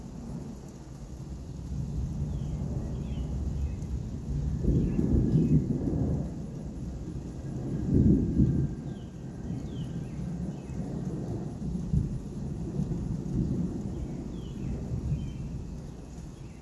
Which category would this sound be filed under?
Sound effects > Natural elements and explosions